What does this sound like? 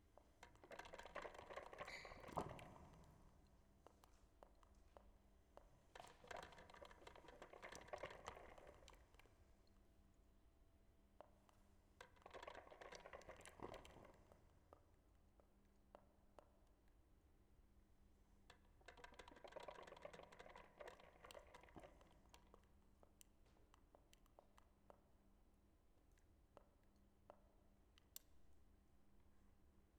Sound effects > Objects / House appliances
A coffe maker in a theatre recorded with my own microphone
atmophere, recording